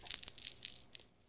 Sound effects > Objects / House appliances
Crossing bead curtain 1(simulated)
A simulated sound of someone crossing a bead curtain, done by multiplying the base audio recording of "Beads" uploaded on the same account.